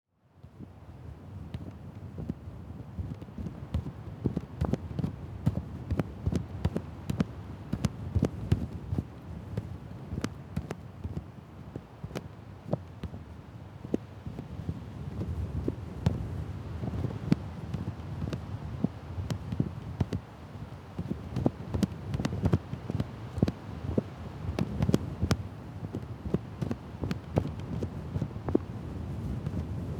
Sound effects > Other
A transat deskchair unnder the wind, close up on the fabric.